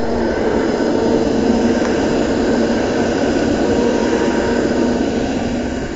Soundscapes > Urban
Passing Tram 4
A sound of a tram passing by. The sound was recorded from Tampere, next to the tracks on the street. The sound was sampled using a phone, Redmi Note 10 Pro. It has been recorded for a course project about sound classification.
city, field-recording, outside, street, traffic, tram, trolley, urban